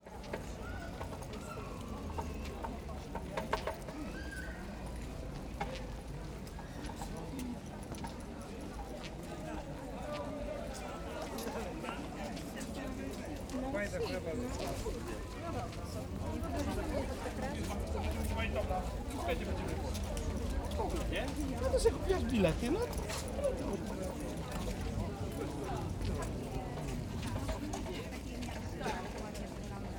Soundscapes > Urban
05. AMBIENCE Jarmark Jakubowy Behind Festival Crowd Festival Market Cathedral Street Old Town Music Traffic Zoom F3 SO.1 A
Jarmark Jakubowy 2025.07.26 Annual festival held by Archcathedral in Szczecin. Field recording in the Old Town district, including crowd, traffic, marketplace, discussion, background music, children, conversation and city ambience. Recorded with Zoom F3 and Sonorous Objects SO.1 microphones in stereo format.
2025, ambience, anturium, cathedral, crowd, fair, field-recording, marketplace, oldtown, people, poland, so1, sonorousobjects, stereo, street, szczecin, traffic, zoomf3